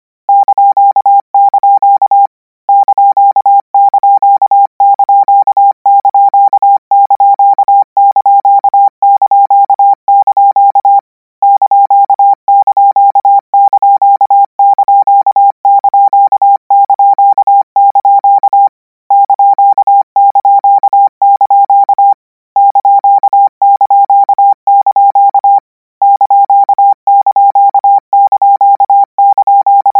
Sound effects > Electronic / Design
Koch 49 ) - 200 N 25WPM 800Hz 90%
Practice hear symbol ')' use Koch method (practice each letter, symbol, letter separate than combine), 200 word random length, 25 word/minute, 800 Hz, 90% volume.